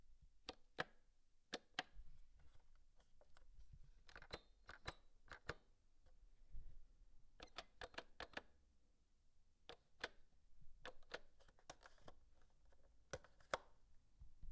Sound effects > Other mechanisms, engines, machines
Vaccuum buttons and switches
rotary switch from my vaccuum
rotary, switch, vaccuum